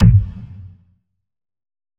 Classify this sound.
Instrument samples > Percussion